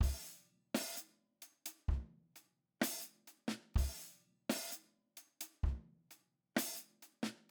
Music > Solo percussion
Short loop 64 BPM in 4
recording, live, loop, kit, studio, drums